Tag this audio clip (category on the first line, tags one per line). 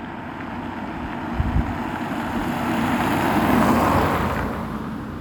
Sound effects > Vehicles
asphalt-road
car
moderate-speed